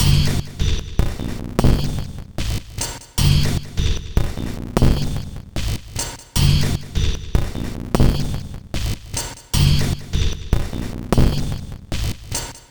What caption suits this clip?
Instrument samples > Percussion
This 151bpm Drum Loop is good for composing Industrial/Electronic/Ambient songs or using as soundtrack to a sci-fi/suspense/horror indie game or short film.
Alien, Ambient, Dark, Drum, Industrial, Loop, Loopable, Packs, Samples, Soundtrack, Underground, Weird